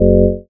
Instrument samples > Synths / Electronic
WHYBASS 2 Gb
fm-synthesis, bass, additive-synthesis